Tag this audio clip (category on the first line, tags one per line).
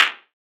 Instrument samples > Percussion
Clap
Industry